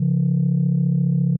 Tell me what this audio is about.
Instrument samples > Synths / Electronic
Landline Phonelike Synth C#4
Holding-Tone, JI, JI-3rd, JI-Third, just-minor-3rd, just-minor-third, Landline, Landline-Holding-Tone, Landline-Phone, Landline-Phonelike-Synth, Landline-Telephone, Landline-Telephone-like-Sound, Old-School-Telephone, Synth, Tone-Plus-386c